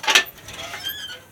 Sound effects > Objects / House appliances
furnace open
Squeaky furnace door opening. Recorded with my phone.
squeak, squeek, open, hatch, metal, furnace, door